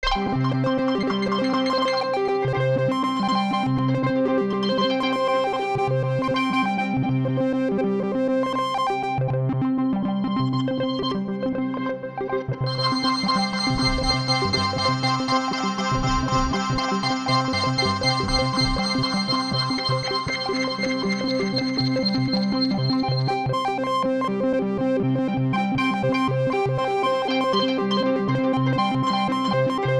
Soundscapes > Synthetic / Artificial
Ambient granular background texture with both dark and brilliant components. Made with Digitakt 2. Sample is from the factory library of the amazing SpaceCraft granular synthesizer app.